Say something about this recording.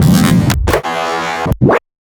Sound effects > Other mechanisms, engines, machines
Sound Design Elements-Robot mechanism-004
Sound Design Elements-Robot mechanism SFX ,is perfect for cinematic uses,video games. Effects recorded from the field.
actuators, clanking, design, digital, elements, gears, hydraulics, metallic, motors, movement, powerenergy, processing, robotic, servos, synthetic, whirring